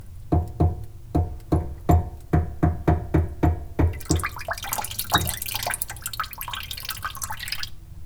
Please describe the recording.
Sound effects > Objects / House appliances
industrial sink water foley-005
bonk, clunk, drill, fieldrecording, foley, foundobject, fx, glass, hit, industrial, mechanical, metal, natural, object, oneshot, perc, percussion, sfx, stab